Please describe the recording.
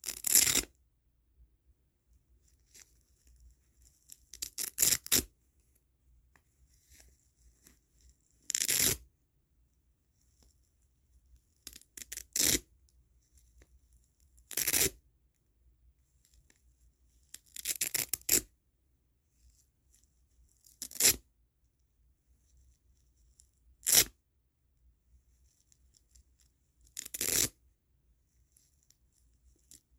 Sound effects > Objects / House appliances
CLOTHRip-Samsung Galaxy Smartphone Velcro, Golf Glove Nicholas Judy TDC
A golf glove velco rip.